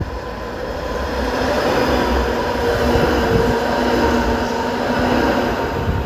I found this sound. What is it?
Sound effects > Vehicles
tram-samsung-9
tram, tramway